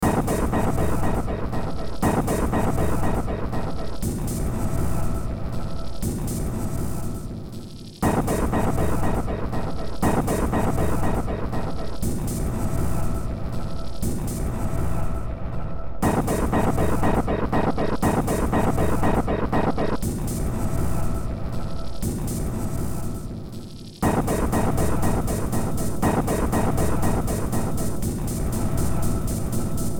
Music > Multiple instruments
Short Track #3690 (Industraumatic)
Sci-fi, Games, Underground, Horror, Cyberpunk, Industrial, Noise, Ambient, Soundtrack